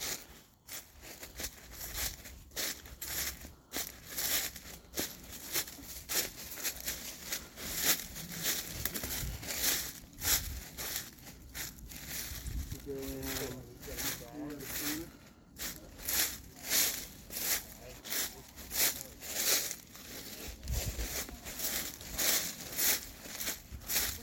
Sound effects > Human sounds and actions
FEETHmn-Samsung Galaxy Smartphone, CU Footsteps, Leaves Nicholas Judy TDC
Footsteps on leaves. Recorded at Hanover Pines Christmas Tree Farm. Some indistinct vocals in background.
leaf, footsteps, rustle, leaves, Phone-recording, foley